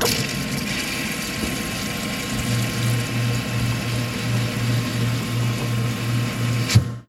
Sound effects > Objects / House appliances
A coca-cola freestyle machine dispensing a soda.